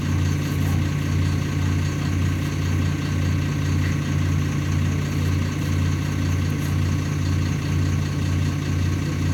Sound effects > Vehicles
bus idle long
MAN/Solaris bus engine idling, interior.